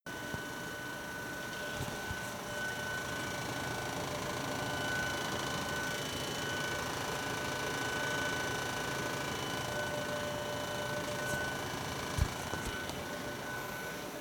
Sound effects > Other mechanisms, engines, machines
Whiney clicky clacky pump running. Recorded with my phone.
pumping, click, machine, pump, whine, clack